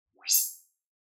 Sound effects > Electronic / Design
Soft Drip Metallic Sound
Drip sound using Ableton FX
drips, metal, percussion, soft